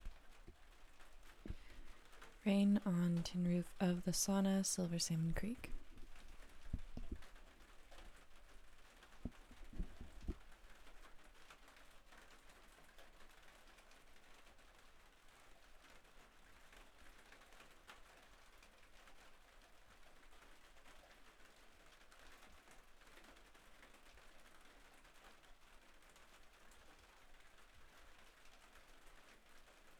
Soundscapes > Nature
Rain on tin roof
Rain falling on the sauna roof at the ranger station at Silver Salmon Creek, Alaska
Alaska, Tin, Rain, Thrush